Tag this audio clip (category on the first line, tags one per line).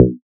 Instrument samples > Synths / Electronic
additive-synthesis
bass
fm-synthesis